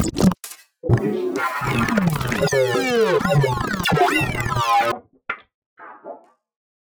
Sound effects > Electronic / Design
Optical Theremin 6 Osc Destroyed-002
Alien, Theremin, Synth, Instrument, Otherworldly, noisey, Sci-fi, Handmadeelectronic, Analog, Spacey, Sweep, DIY, Scifi, Glitch, Theremins, Trippy, Noise, Robot, Optical, Infiltrator, Digital, Robotic, SFX, Glitchy, FX, Dub, Electronic, Bass, Electro, Experimental